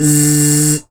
Sound effects > Human sounds and actions
TOONVox-Blue Snowball Microphone, CU Vocal Buzzer Nicholas Judy TDC
A vocal buzzer.
Blue-brand, Blue-Snowball, cartoon, vocal, buzzer